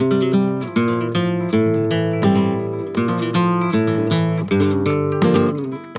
Music > Solo instrument
Flamenco guitarist busking in Sevilla recorded on a phone. Cleaned up and re-tuned to Dm in Bitwig... conveys the beautiful ambience of a beautiful city.
Flamenco, Guitar, Sevilla